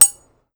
Music > Solo percussion
A muted triangle ring.